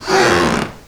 Sound effects > Objects / House appliances
Floorboards creaking, hopefully for use in games, videos, pack of 18.